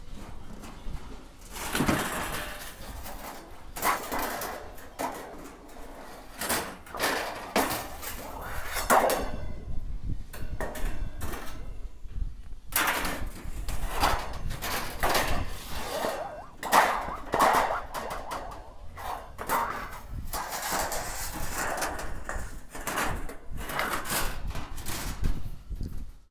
Sound effects > Objects / House appliances
Junkyard Foley and FX Percs (Metal, Clanks, Scrapes, Bangs, Scrap, and Machines) 199
Ambience, Clank, Environment, Junkyard, Machine, Perc, Percussion, rubbish, scrape, Smash, trash, waste